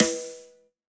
Instrument samples > Percussion
LUNA Ludwig Blk Beauty 01
6.5" x 14" Ludwig Snare recorded with a Shure SM 57 mic in a 10 x 22' room. Recording was processed with Presonus Studio DAW using compression and EQ.